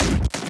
Sound effects > Experimental
destroyed glitchy impact fx -014
alien, percussion, impacts, pop, whizz, snap, lazer, crack